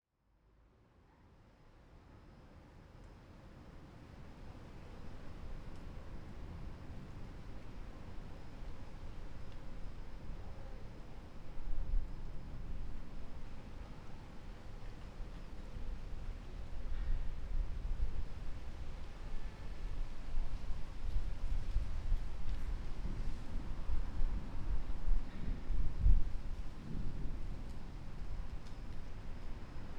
Soundscapes > Urban
250613 223150 FR Thunderstorm arriving over suburbs
Thunderstorm arriving over the suburbs. I made this recording from my balcony, located in Nanterre (suburbs of Paris, France), during a hot evening of June, while a thunderstorm arrived over the suburbs. First, one can hear the calm atmosphere of the suburban area, with some distant voices, some noises from the surroundings, some vehicles passing by in the street, some drops of rain, and thunder from time to time. Starting at #5:00, the rain increases progressively, to become relatively heavy, and thunder continues striking at times. Recorded in June 2025 with a Zoom H5studio (built-in XY microphones). Fade in/out applied in Audacity.